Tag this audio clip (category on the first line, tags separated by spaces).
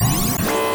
Sound effects > Electronic / Design
digital glitch hard mechanical one-shot pitched stutter